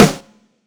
Instrument samples > Percussion
jazzsnare amateur 1
drysnare, harshsnare, bluntsnare, barren-snare, plainsnare, unembellished-snare A mixture of many snares (search my snare folder). I don't like it. It MIGHT be useful as an attenuated timbre.
barren-snare, bluntsnare, drum, drums, drysnare, harshsnare, jazz-snare, jazzsnare, plainsnare, snare, unembellished-snare